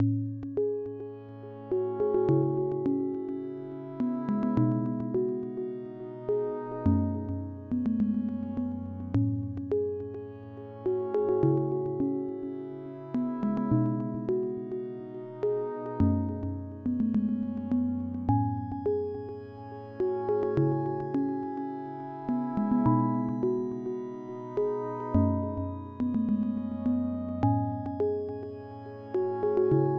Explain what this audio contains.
Music > Multiple instruments
Frutiger Aero Incoming Call Ringtone 04 "Southwinds"
Frutiger Aero Incoming Call Ringtone 04, called "Southwinds". Incoming call ringtone in the style of early 2000s Frutiger aero. 105 bpm, made in FL Studio using FL BooBass, reverb, delay, EQ, and patcher. The sound of receiving a call on a new messenger app in 2005.
2000s, aero, calling, facetime, frutiger, frutiger-aero, incoming-call, operating-system, ringtone, skype, tone, video-call, voice-call